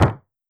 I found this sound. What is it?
Sound effects > Human sounds and actions
LoFiFootstep Gravel Walking-02
Shoes on gravel, walking. Lo-fi. Foley emulation using wavetable synthesis.
footstep, walk, lofi, walking, running, footsteps, gravel, steps